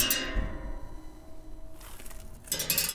Sound effects > Objects / House appliances
Junkyard Foley and FX Percs (Metal, Clanks, Scrapes, Bangs, Scrap, and Machines) 67
Machine, trash, Ambience, Robot, Robotic, Bang, rattle, garbage, Percussion, FX, Dump, Bash, Smash, tube, Environment, Junkyard, dumping, Metallic, Atmosphere, Perc, Clank, dumpster, scrape, Foley, Junk, rubbish, Metal, Clang, SFX, waste